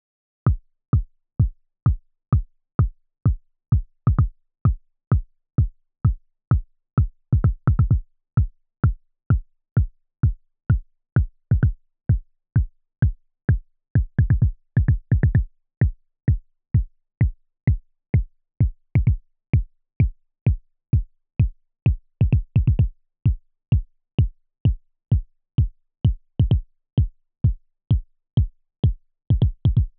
Instrument samples > Percussion
A synthetic kick drum in G that slowly modulates and gets more and more processed and distorted
kick, synthetic, bass, drum